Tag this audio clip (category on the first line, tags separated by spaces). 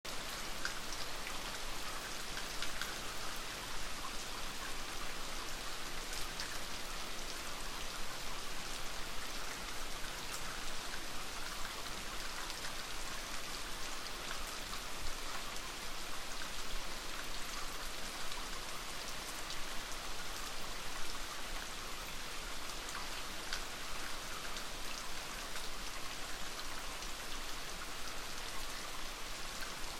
Sound effects > Natural elements and explosions
storm raining rain thunder water weather